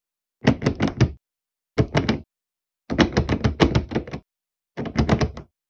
Sound effects > Objects / House appliances
locked door
Pretending to be locked in my bathroom. Recorded with iPhone 11 microphone.
door, handle, lock, locked, rattle